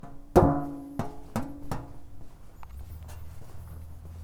Sound effects > Other mechanisms, engines, machines
Woodshop Foley-083
sound, sfx, thud, boom, bam, bang, percussion, knock, foley, rustle, bop, wood, perc, crackle, shop, metal, strike, little, tools, fx